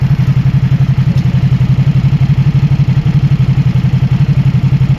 Sound effects > Other mechanisms, engines, machines
puhelin clip prätkä (7)
Supersport, Motorcycle, Ducati